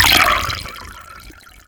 Instrument samples > Percussion
• I drew the waveform's envelope to be a staircase/stepped/escalator fade out = terracefade downtoning. tags: percussion percussive water pee peedrum drum drums crash alienware UFO glass pouring liquid wet aqua fluid H2O moisture rain drizzle dew stream flow tide wave current droplet splash other tags: urination micturition pee pissing wee tinkling taking-a-leak doing-a-number-one number-one piddle tinkle non-reproductive-rapid-whangerjob